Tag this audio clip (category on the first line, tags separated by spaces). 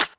Instrument samples > Percussion
1lovewav 1-shot clap drum drums kit percussion